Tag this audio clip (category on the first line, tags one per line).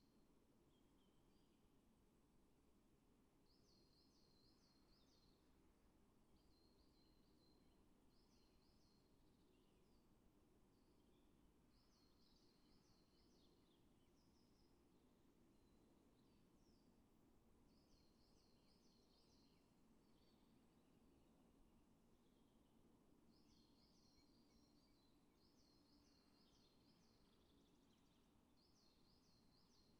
Soundscapes > Nature
field-recording
phenological-recording
natural-soundscape
soundscape
sound-installation
nature
Dendrophone
raspberry-pi
artistic-intervention
weather-data
alice-holt-forest
modified-soundscape
data-to-sound